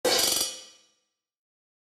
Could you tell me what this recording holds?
Music > Solo percussion
from my achievement sound